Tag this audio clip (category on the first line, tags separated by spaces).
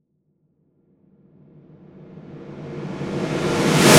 Sound effects > Electronic / Design
drums metal percs drum reverse snare percussive effect percussion reverse-snare fx sfx sound effects hit sample djent woosh metalcore